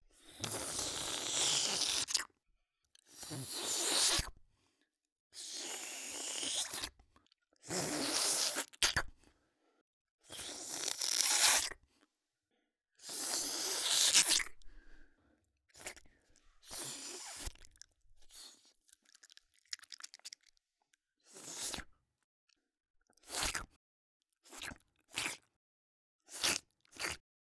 Sound effects > Human sounds and actions
Cartoon - Tongue Licking SFX
Exaggerated licking sounds. A cartoon character taking a long, over-the-top lick of a big rainbow lolipop. Could also be used for a pet like a dog or cat licking something.
Candy, Cartoon, Cat, Cream, dog, Ice, Icecream, Lick, Licking, Lolipop, pet, popsicle, slurp, Tongue, treat